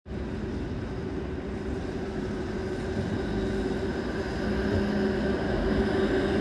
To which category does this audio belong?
Sound effects > Vehicles